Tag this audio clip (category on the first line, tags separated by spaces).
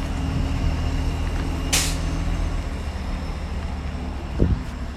Sound effects > Vehicles
brake Bus driving